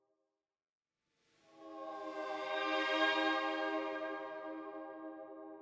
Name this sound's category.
Music > Other